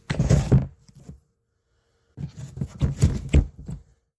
Sound effects > Objects / House appliances
An ice cream container lid opening and closing.